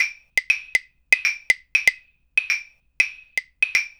Music > Solo percussion
Three Claves-7
drum, drums, 120BPM, pack, clave, loops, loop, claves